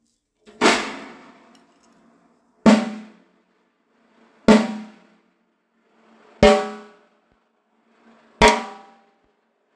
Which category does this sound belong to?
Instrument samples > Percussion